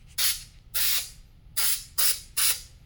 Sound effects > Human sounds and actions
Spray Can Spray
A spray can being sprayed recorded on my phone microphone the OnePlus 12R
paint, short, spray-can